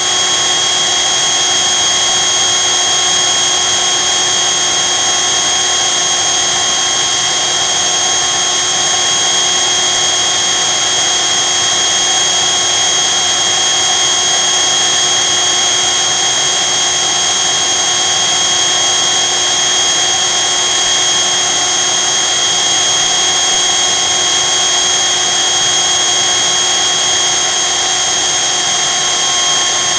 Sound effects > Objects / House appliances
Vacuum Cleaner 01
The Rode M5 microphone was placed 12 inches above a small handheld vacuum cleaner, which was turned on and allowed to run for about two minutes. Those sounds were captured using a Zoom H4N multitrack recorder; and soon thereafter normalized using Audacity.